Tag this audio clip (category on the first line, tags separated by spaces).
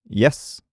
Speech > Solo speech
2025 Adult affirmation approval Calm FR-AV2 Generic-lines Hypercardioid july Male mid-20s MKE-600 MKE600 Sennheiser Shotgun-mic Shotgun-microphone Single-mic-mono Tascam VA Voice-acting yes